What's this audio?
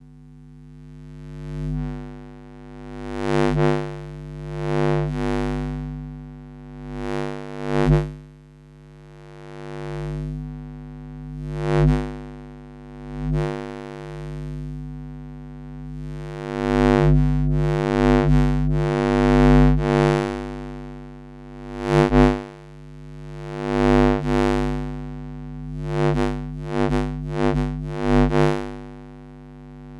Sound effects > Objects / House appliances
Electromagnetic field recording of an Induction Stove Electromagnetic Field Capture: Electrovision Telephone Pickup Coil AR71814 Audio Recorder: Zoom H1essential